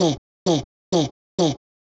Speech > Solo speech
BrazilFunk Vocal Chop One-shot 19 130bpm
FX, Vocal